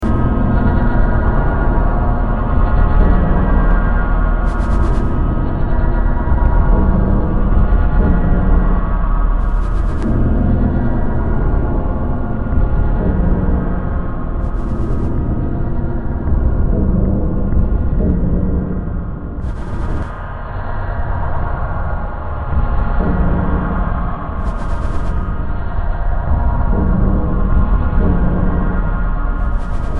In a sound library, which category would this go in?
Music > Multiple instruments